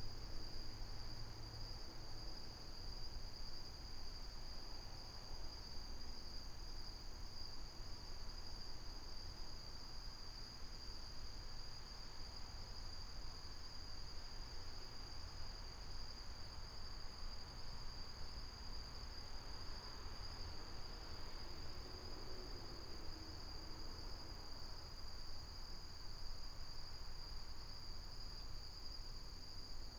Soundscapes > Urban
Nighttime Crickets in Florida 1
A nighttime recording of crickets from an urban backyard in north Florida in December. Traffic can be heard low in the background.
crickets, florida, night, tallahassee, winter